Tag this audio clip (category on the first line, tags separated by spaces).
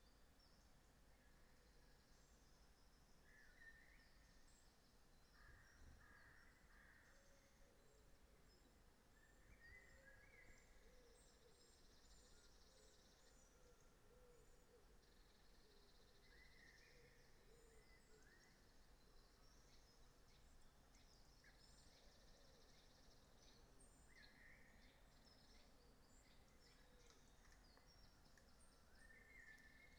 Soundscapes > Nature
alice-holt-forest artistic-intervention field-recording modified-soundscape nature phenological-recording raspberry-pi weather-data